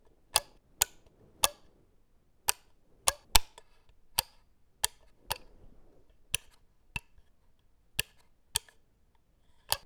Sound effects > Objects / House appliances
SFX Indoor LightSwitchOnOff
Record Zoom h1n
subtle, indoor, click, off, background, light-switch, mechanical, foley, field-recording, soundscape